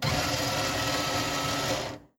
Sound effects > Other mechanisms, engines, machines
MOTRSrvo-Samsung Galaxy Smartphone, CU enMotion Automatic Paper Towel Dispenser Nicholas Judy TDC
An enMotion automatic paper towel dispenser dispensing a paper towel. Recorded at the Richmond VA Medical Center.
automatic, dispense, dispenser, enmotion, paper-towel, Phone-recording